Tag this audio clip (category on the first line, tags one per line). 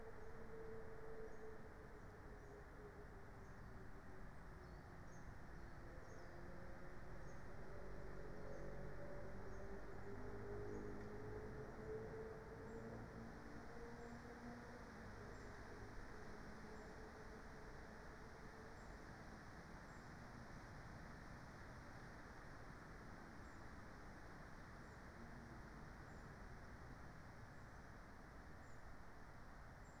Soundscapes > Nature
modified-soundscape
field-recording
raspberry-pi
Dendrophone
data-to-sound
soundscape
sound-installation
artistic-intervention
nature
weather-data
phenological-recording
alice-holt-forest